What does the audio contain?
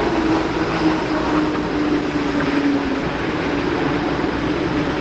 Sound effects > Vehicles
Tram decelerating to steady speed whilst approaching to pass by. Recorded from an elevated position next to the tram track, with the default device microphone of a Samsung Galaxy S20+. TRAM: ForCity Smart Artic X34